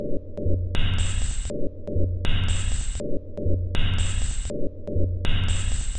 Instrument samples > Percussion
This 160bpm Drum Loop is good for composing Industrial/Electronic/Ambient songs or using as soundtrack to a sci-fi/suspense/horror indie game or short film.
Samples, Industrial, Underground, Weird, Packs, Loopable, Dark, Drum, Loop, Alien, Ambient, Soundtrack